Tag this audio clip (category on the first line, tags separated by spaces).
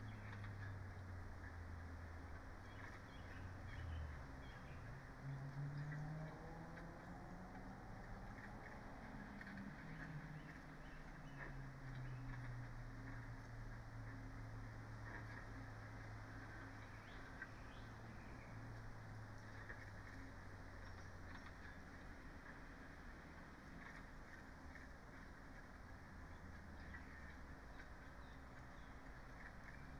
Soundscapes > Nature
soundscape data-to-sound alice-holt-forest nature weather-data artistic-intervention phenological-recording raspberry-pi sound-installation natural-soundscape modified-soundscape field-recording Dendrophone